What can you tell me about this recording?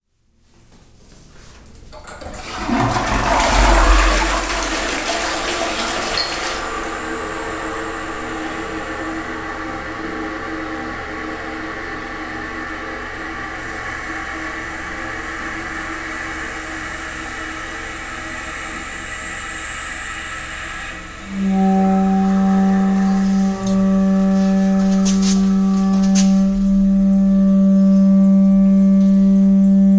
Sound effects > Objects / House appliances
Roca Toilet flush vibrating at the end
Roca Vibrating flush filling (recorded 2022)